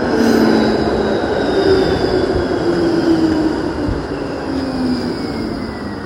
Sound effects > Vehicles
Tram arrival and departure sequences including door chimes and wheel squeal. Wet city acoustics with light rain and passing cars. Recorded at Sammonaukio (19:00-20:00) using iPhone 15 Pro onboard mics. No post-processing applied.